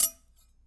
Sound effects > Objects / House appliances
Metal Tink Oneshots Knife Utensil 11
Beam, Clang, ding, Foley, FX, Klang, Metal, metallic, Perc, SFX, ting, Trippy, Vibrate, Vibration, Wobble